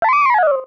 Sound effects > Other mechanisms, engines, machines
Small Robot - Cute
A cute sounding small robot talk, bleeping. I originally designed this for some project that has now been canceled. Designed using Vital synth and Reaper